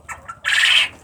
Sound effects > Animals
Fowl - Japanese Quail; Trill
Recorded with an LG Stylus 2022.
farm, crow, quail, bird, trill, fowl, barnyard, gamebird, barn, poultry